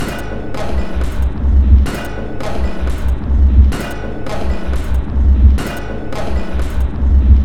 Instrument samples > Percussion
This 129bpm Drum Loop is good for composing Industrial/Electronic/Ambient songs or using as soundtrack to a sci-fi/suspense/horror indie game or short film.
Alien, Ambient, Dark, Drum, Industrial, Loop, Loopable, Packs, Samples, Soundtrack, Underground, Weird